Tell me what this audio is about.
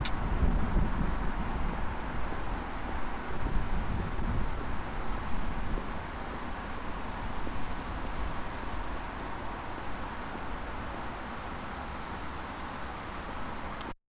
Sound effects > Natural elements and explosions
Walking on a stormy night, recorded using a TASCAM DR-05X